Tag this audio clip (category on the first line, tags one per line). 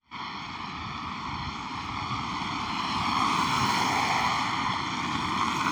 Sound effects > Vehicles
car
drive
vehicle